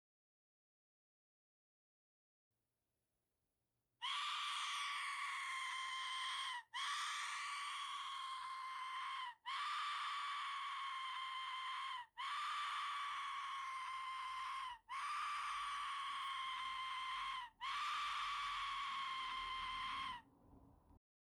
Sound effects > Experimental
AZTEEK FLUIT
Sound of an Azteek deathwistle, recorded with my TASCAM fieldrecorder DR-05X. Sound recorded during multiple tests with an original Azteek deathwistle. Sound is not perfect, working on it!
Deathwistle, Azteek, Ancient